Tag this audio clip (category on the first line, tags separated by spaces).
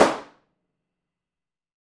Sound effects > Natural elements and explosions
32float float High Impulse IR Response